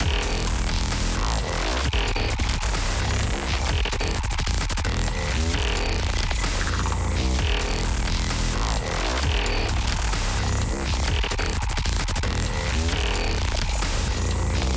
Music > Other
Glitch Loop 06
A designed glitch loop created in Reaper with a bunch of VST's.
synthetic, electronic, digital, loop